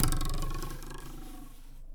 Sound effects > Other mechanisms, engines, machines
metal shop foley -129
sfx, sound, wood, little, thud, pop, oneshot, tools, bop, metal, bam, shop, perc, crackle, strike, knock, fx, rustle, percussion, boom, bang, tink, foley